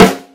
Instrument samples > Percussion
A&F Drum Co. 5.5x14 Steam Bent Solid Maple Shell Whisky Field Snare 3
bass-snare, doomsnare, drum, drums, goodsnare, main-snare, metal, percussive, pop, rock, snare, snared-drum, thrash-metal